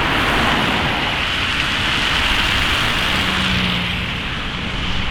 Sound effects > Vehicles
Car00062552CarMultiplePassing
automobile, car, drive, field-recording, rainy, vehicle